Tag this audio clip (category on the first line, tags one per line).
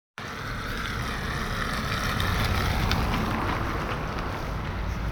Soundscapes > Urban
Car
passing
studded
tires